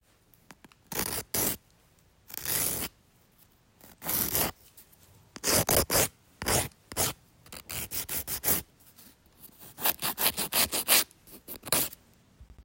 Sound effects > Objects / House appliances
nagu vīle / nail file
Household objects for sound recognition game
household, recording